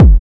Instrument samples > Percussion
Phonk Kick 2
A kick retouched with ''Attack kick 13'' from FLstudio original sample pack, and tweak some ''Pogo'' amount for it in FLstudio sampler. Processed with waveshaper, ZL EQ, Fruity Limiter.
Distorted, Kick, Phonk